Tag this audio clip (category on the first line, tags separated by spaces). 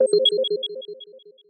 Sound effects > Electronic / Design
interface digital confirmation alert massage selection